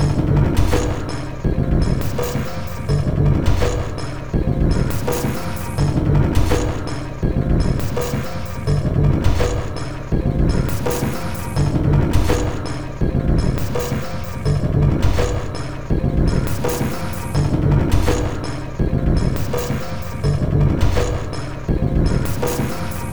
Instrument samples > Percussion
This 83bpm Drum Loop is good for composing Industrial/Electronic/Ambient songs or using as soundtrack to a sci-fi/suspense/horror indie game or short film.
Samples, Ambient, Alien, Soundtrack, Underground, Weird, Loopable, Drum, Loop, Dark, Packs, Industrial